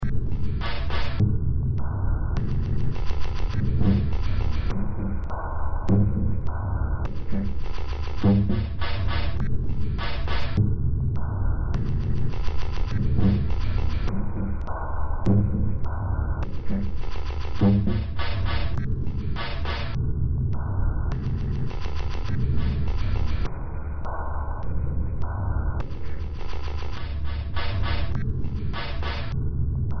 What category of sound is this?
Music > Multiple instruments